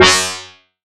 Instrument samples > Synths / Electronic
SLAPMETAL 1 Gb
additive-synthesis, bass, fm-synthesis